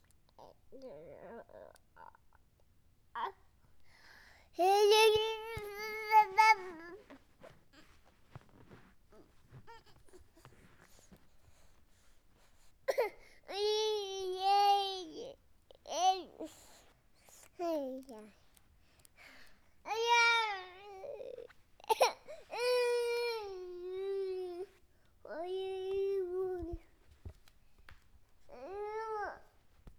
Sound effects > Human sounds and actions
Recording of 1 year old sad and happy